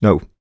Speech > Solo speech
Displeasure - No 2
dialogue, displeasure, displeasured, FR-AV2, Human, Male, Man, Mid-20s, Neumann, no, nope, NPC, oneshot, refusal, singletake, Single-take, talk, Tascam, U67, Video-game, Vocal, voice, Voice-acting, Word